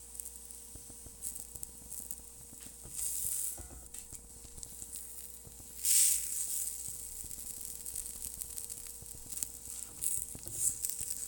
Sound effects > Objects / House appliances
FOODCook snap thunder pop spark burst squeak hiss vibration echo tapping
Melting cheese, with crackling and popping, echoing in the pan.
burst, echo, hiss, pop, snap, spark, squeak, tapping, thunder, vibration